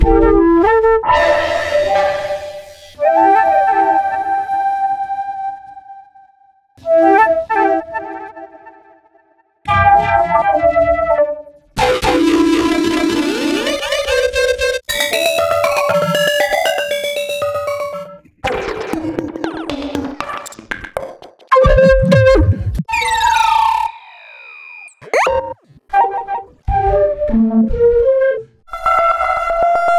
Sound effects > Experimental
Flute Processed FX Sequence (glitchy and trippy)
A series of glitchy heavily processed natural flute sounds. Flute performed by RJ Roush and recorded at Studio CVLT in the redwoods of Arcata, CA in Humboldt County. Recorded with a Sure SM57a microphone through an Audiofuse interface into Reaper and processed with Fab Filter, Izotope, Shaperbox, Pigments, Arturia, Minimal Audio, and more